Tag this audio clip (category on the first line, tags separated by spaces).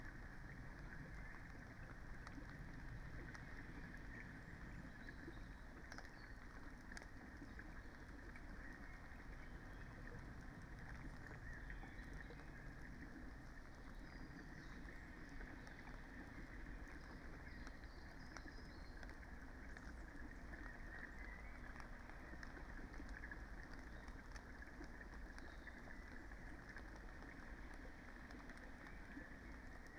Soundscapes > Nature
data-to-sound sound-installation nature Dendrophone artistic-intervention field-recording soundscape natural-soundscape raspberry-pi modified-soundscape phenological-recording weather-data alice-holt-forest